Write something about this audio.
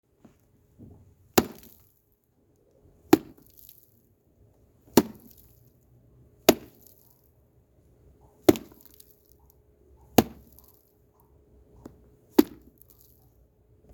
Sound effects > Objects / House appliances
Knocking Sand from Boots
Kicking the dirt off a pair of riding boots. You can hear the remnants between each hit.